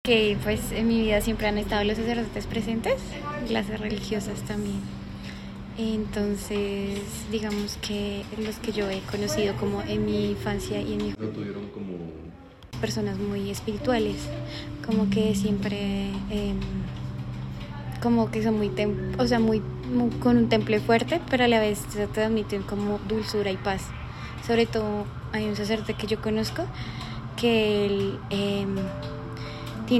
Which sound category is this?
Speech > Conversation / Crowd